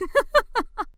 Sound effects > Human sounds and actions
A woman giggling, recorded indoor background noises removed.